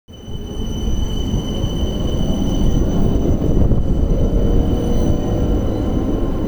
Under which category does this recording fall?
Sound effects > Vehicles